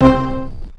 Music > Multiple instruments
Orchestral hit i made in furnace.